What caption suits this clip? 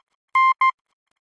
Sound effects > Electronic / Design
A series of beeps that denote the letter N in Morse code. Created using computerized beeps, a short and long one, in Adobe Audition for the purposes of free use.
Language, Morse, Telegragh